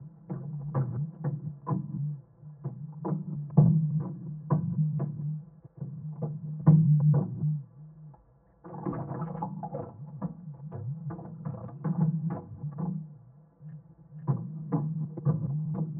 Sound effects > Experimental
Plastic Fermentation Container Water EQ
Plastic container filled with water, recording